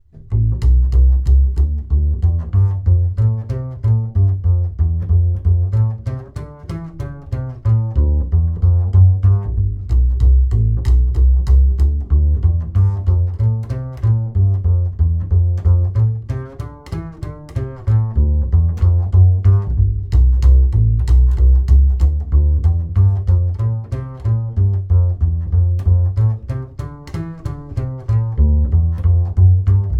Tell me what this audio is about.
Music > Solo instrument
Bouba / Buba
his sound has been edited and processed from the original recording.